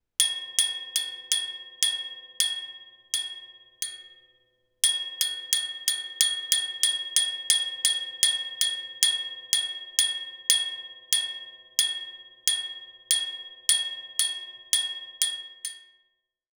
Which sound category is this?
Sound effects > Objects / House appliances